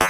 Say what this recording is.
Sound effects > Electronic / Design
RGS-Glitch One Shot 21
Processed with ZL EQ and Waveshaper.